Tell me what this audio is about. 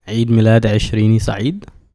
Speech > Solo speech
Happy 20th birthday - Arabic
My Egyptian housemate saying _ In Arabic.